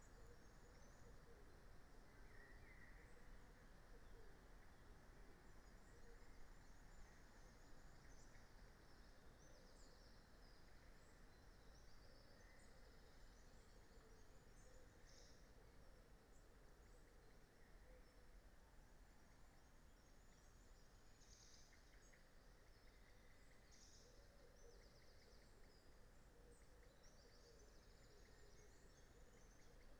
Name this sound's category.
Soundscapes > Nature